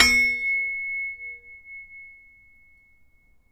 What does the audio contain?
Sound effects > Other mechanisms, engines, machines
metal shop foley -088
percussion, tools, rustle, strike, bop, metal, little, sfx, shop, perc, knock, thud, boom, fx, oneshot, bang, bam, foley, tink, pop, wood, crackle, sound